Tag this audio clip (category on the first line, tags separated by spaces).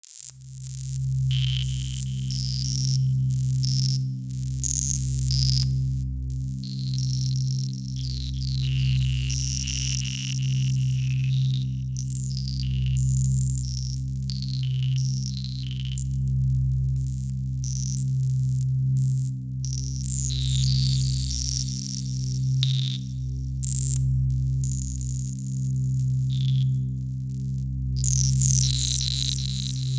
Music > Other
evolving ambient soundscape multisample smooth experimental electromagnetic divine dreamy artificial drone space pad